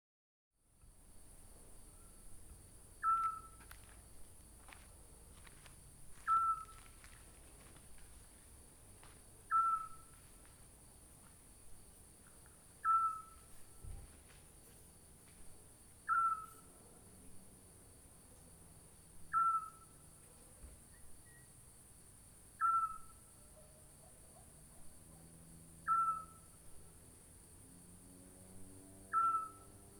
Soundscapes > Nature
20250608 23h Marata de nit
Recording of a night ambience in Marata, right where I used to live. In the first minutes of the recording this can be appreciated. Then the owl moves to different places, but her call can still be heard with different intensities and tones throughout the recording. If you listen very closely, you might be able to hear some almost inaudible human voices in the background at some points. These are the local theatre group, who were rehearsing that night. I remember hearing their voices while the recording was being made, but with a very low volume. In the actual recording, I can't really tell if it is my imagination or the voices are there. The recording was made on 8/6/2025, at around 23h, only some weeks before I moved into a new place.
spring, Marata, scops-owl, nature, field-recording, ambience, night